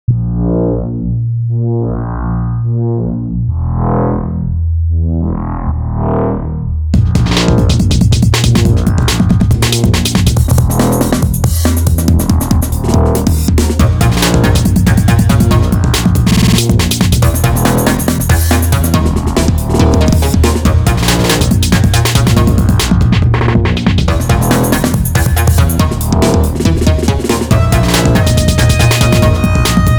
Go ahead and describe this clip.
Music > Multiple instruments
Jungle Break A# min 140bpm
A hard-hitting Jungle Drum and Bass loop and melody created in FL Studio using Phaseplant, Fab Filter, Valhalla, Autoformer, breaks, Gross Beat, Rift, Raum and a few other goodies. enjoy~
drums, break, jungle, drumbeat, techno, electro, wavetable, dance, funky, perc, bass, rhythm, destroy, kit, hard, glitch, edm, drumandbass, loopable, breakbeat, 140-bpm, drumloop